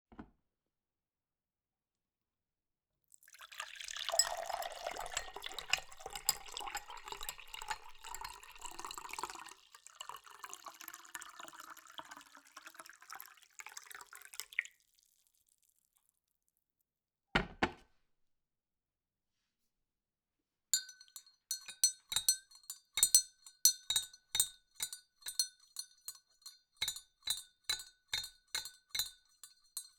Sound effects > Objects / House appliances
GLASSTware glass fill stir
Fill glass with water and stir with a metal stirrer (not a spoon).
cup, fill, glass, liquid, metal, pour, sfx, stick, water